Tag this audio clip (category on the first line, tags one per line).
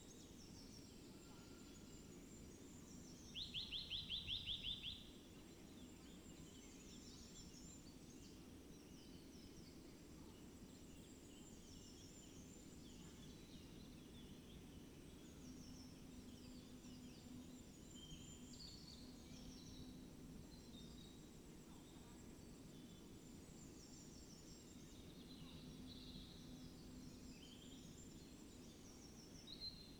Soundscapes > Nature
phenological-recording
artistic-intervention
weather-data
natural-soundscape
modified-soundscape
nature
raspberry-pi
soundscape
data-to-sound
field-recording
Dendrophone
sound-installation
alice-holt-forest